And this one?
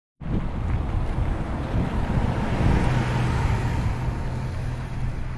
Sound effects > Vehicles
A bus passes by
bus, bus-stop, Passing